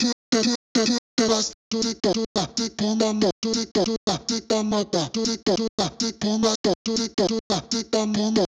Music > Other
Random BrazilFunk Vocalchop 1
Acapella, BrazilFunk, BrazilianFunk, EDM, vocal, VocalChop